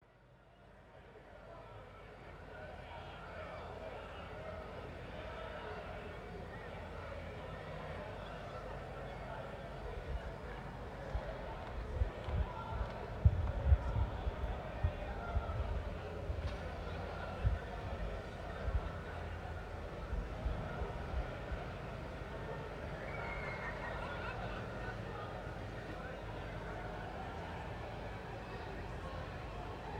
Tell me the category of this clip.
Soundscapes > Urban